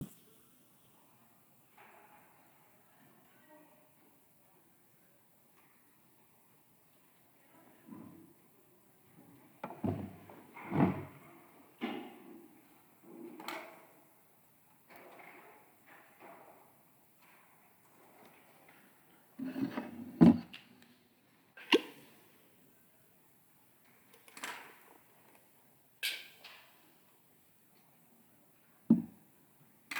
Soundscapes > Indoors
The UPF Library - Later at Night
A recording from sitting in a quiet part of the UPF library later at night.
Library, quietBarcelona, UPF